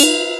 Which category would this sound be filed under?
Instrument samples > Percussion